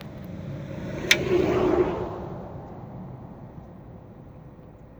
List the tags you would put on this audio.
Sound effects > Vehicles
automobile car vehicle

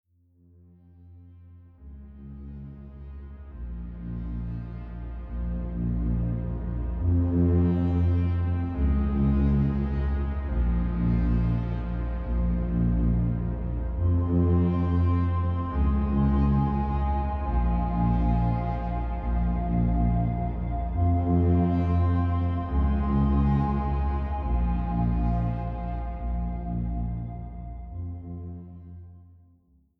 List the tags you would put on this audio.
Soundscapes > Synthetic / Artificial
thriller thrill ominous background sinister suspense atmosphere mystery dark tense horror drama

